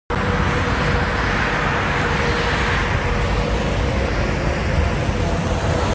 Sound effects > Vehicles
Car passing by in highway